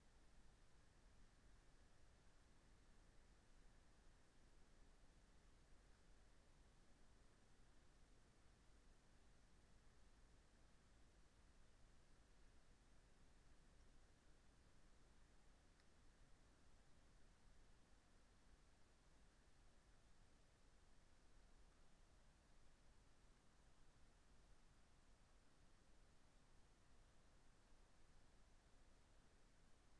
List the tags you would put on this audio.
Soundscapes > Nature
sound-installation nature weather-data phenological-recording field-recording data-to-sound modified-soundscape Dendrophone natural-soundscape artistic-intervention raspberry-pi alice-holt-forest soundscape

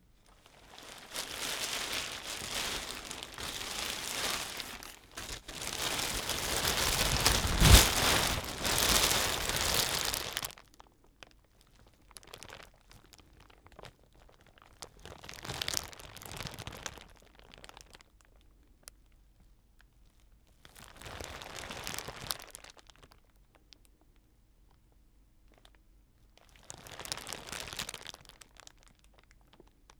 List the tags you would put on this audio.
Sound effects > Human sounds and actions
cloth crumpling foley plastic polythene poncho rain raincoat reaper sheet

